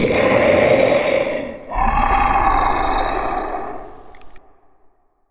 Sound effects > Experimental
Creature Monster Alien Vocal FX (part 2)-015
zombie, mouth